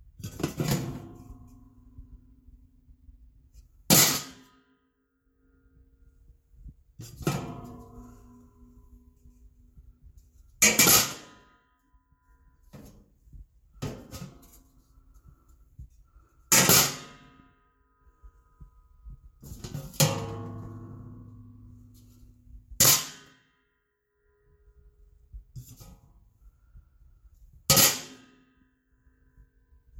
Sound effects > Objects / House appliances
A water bath canning lid open and close.